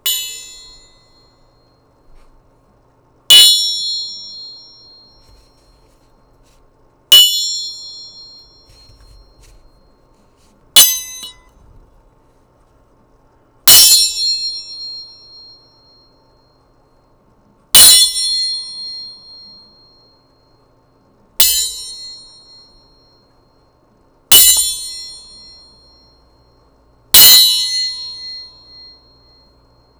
Music > Solo percussion
BELLGong-Blue Snowball Microphone, CU Metal, Ringing, Various Nicholas Judy TDC
A 'desktop' metal gong ringing.
Blue-brand, Blue-Snowball, desktop, gong, metal, ring